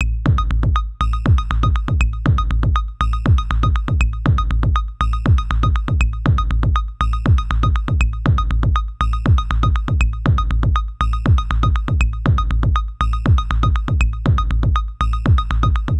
Music > Solo percussion
This is a drum loop created with my beloved Digitakt 2. I just used 4 samples but I edit some of them singularly in terms of delay, tune, reverb and chorus.